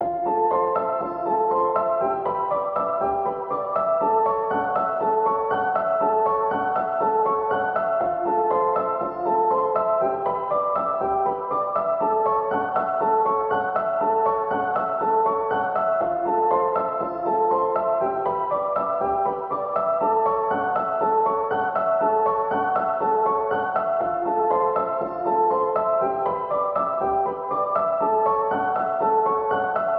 Music > Solo instrument
Piano loops 173 efect 4 octave long loop 120 bpm
120, reverb, simplesamples, piano, pianomusic, samples